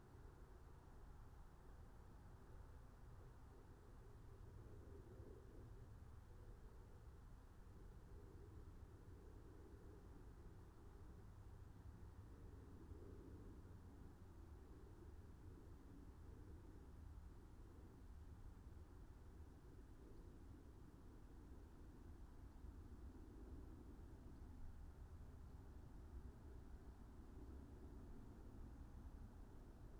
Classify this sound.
Soundscapes > Nature